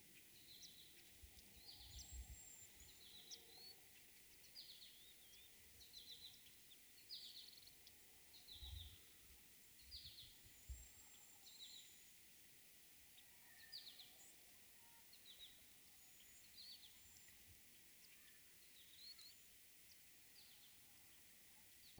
Soundscapes > Nature
Birds in eastern Ontario
Song sparrow, swamp sparrow, American goldfinch, cedar waxwing, and least sandpiper. Bruant chanteur, bruant des marais, chardonneret jaune, jaseur d'Amérique et bécasseau minuscule. Tascam DR-60 RodeNTG3
bird, birds, birdsong, field-recording, nature